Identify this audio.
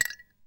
Sound effects > Objects / House appliances
Ice cube falling in glass 3
Ice cube falling into a glass
clink, clinking, dink, drop, glass, glasses, ice, ice-cube, rattle